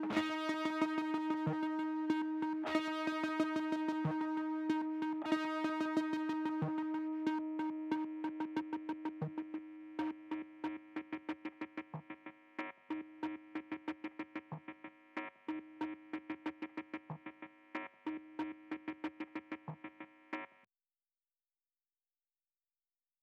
Music > Other
a sound made with a guitar